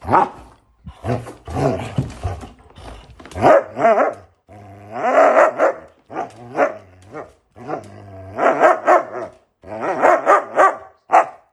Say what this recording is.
Sound effects > Animals
Malinois Dog Barking Growling in Play
Dog play-fighting with owner, pulling a tug toy while bar-growling. First bark is a reaction to being told to let go. Starting with sample 34,669 is a continuous recording of the dog growling while pulling a bite tug toy. The dog grabbed the toy with its left side and front of the muzzle. Pawsteps on carpet and wooden floor can be heard. Some kind of automatic noise reduction seems to be applied by the recording iPhone.